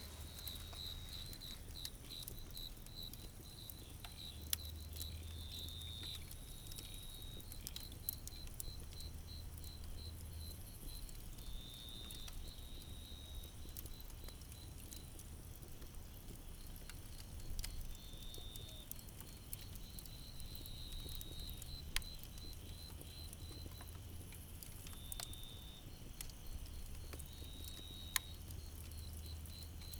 Soundscapes > Nature
Fire Outside Near Lake, NSW, Australia
Lake, Fire, Outside, Near